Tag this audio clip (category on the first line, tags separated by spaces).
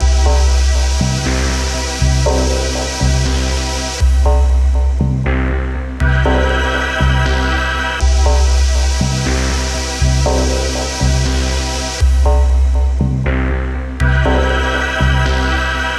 Music > Solo instrument
80s Analog Analogue Brute Casio Electronic Loop Melody Polivoks Soviet Synth Texture Vintage